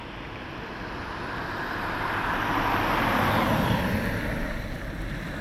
Sound effects > Vehicles
Car 2025-10-27 klo 20.12.59
Sound recording of a car passing by. Recording done next to Hervannan valtaväylä, Hervanta, Finland. Sound recorded with OnePlus 13 phone. Sound was recorded to be used as data for a binary sound classifier (classifying between a tram and a car).
Car, Field-recording, Finland